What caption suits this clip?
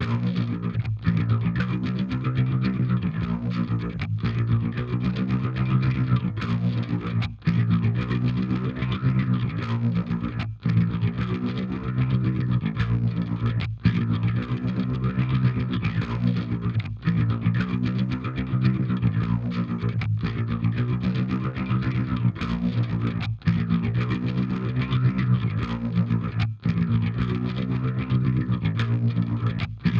Instrument samples > String
Self recorded guitar groove sound,hope it's useful.